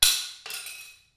Sound effects > Objects / House appliances
Wrench falling impact reverberated
Metal wrench falling in an empty garage.
impact, reverb